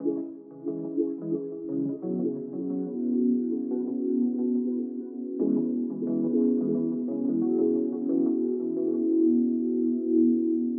Music > Multiple instruments
89 - Shutter Pad LP
it sound like a east asian sound pluck with a deep pad sound.